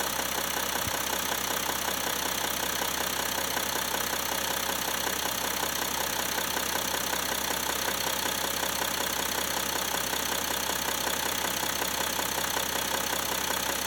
Sound effects > Vehicles
dv6 idle outside
Diesel V6 engine idling, taken outside the car with the hood open (~1m away). Recorded with my phone.
car, diesel, engine, idle, motor, v6